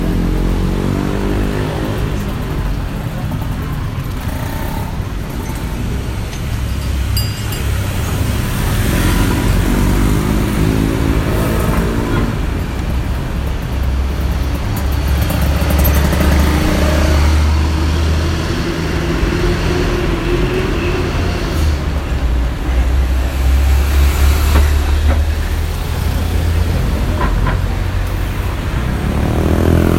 Soundscapes > Urban
Street Ambience, Chinatown, Bangkok, Thailand (Feb 22, 2019)
Street recording in Chinatown, Bangkok, on February 22, 2019. Captures the mix of traffic and lively energy.
ambience, Bangkok, Chinatown, street, Thailand, traffic, vendors